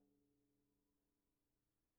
Music > Solo instrument
Mid low Tom Sonor Force 3007-002
Crash, Custom, Cymbal, Cymbals, Drum, Drums, FX, GONG, Hat, Kit, Metal, Oneshot, Paiste, Perc, Percussion, Ride, Sabian